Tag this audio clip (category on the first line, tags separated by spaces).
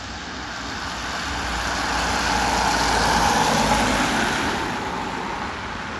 Soundscapes > Urban
Car Drive-by field-recording